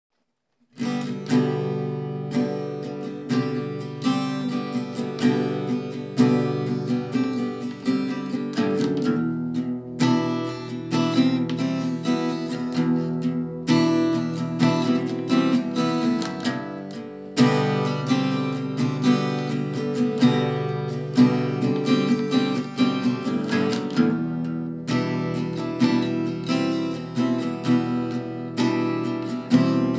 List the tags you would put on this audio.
Music > Solo instrument
guitar
acoustic

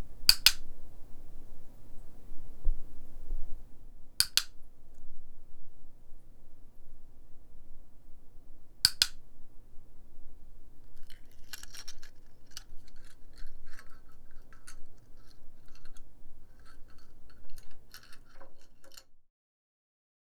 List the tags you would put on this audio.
Sound effects > Objects / House appliances
ASMR
Dog
Clicker
Puppy

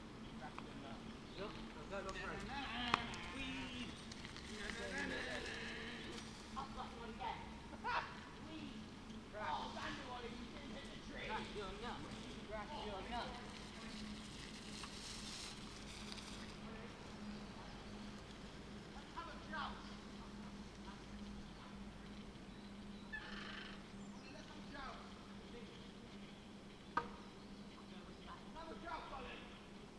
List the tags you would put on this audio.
Soundscapes > Nature
field-recording
sound-installation
natural-soundscape
alice-holt-forest
raspberry-pi
Dendrophone
artistic-intervention
modified-soundscape
nature
weather-data
phenological-recording

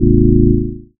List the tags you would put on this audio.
Instrument samples > Synths / Electronic
bass
fm-synthesis

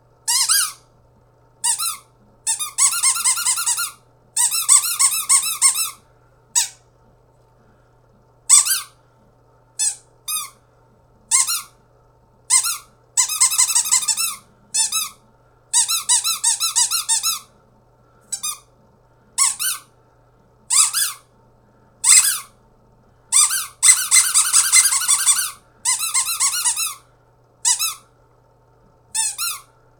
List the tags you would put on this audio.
Sound effects > Objects / House appliances
cartoon
Blue-brand

